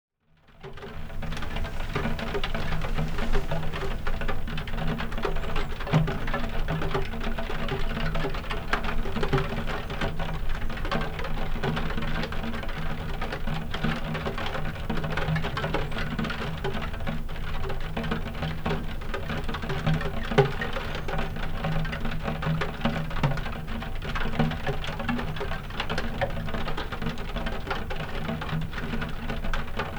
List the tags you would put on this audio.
Soundscapes > Indoors
room ambient roomtone rain window ambientrecording weather ambience

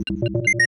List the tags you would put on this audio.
Sound effects > Electronic / Design
alert confirmation digital interface message sci-fi selection

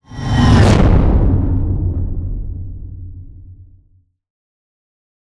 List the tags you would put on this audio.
Sound effects > Other
ambient audio cinematic design dynamic effect effects elements fast film fx motion movement production sound sweeping swoosh trailer transition whoosh

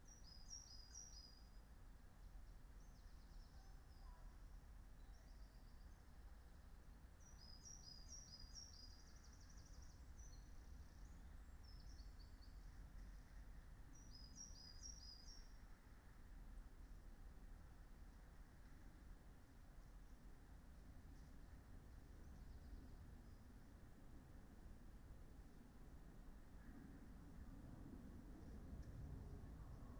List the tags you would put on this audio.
Soundscapes > Nature
modified-soundscape sound-installation data-to-sound natural-soundscape soundscape Dendrophone weather-data phenological-recording field-recording artistic-intervention raspberry-pi alice-holt-forest nature

